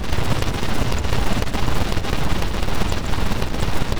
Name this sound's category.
Sound effects > Electronic / Design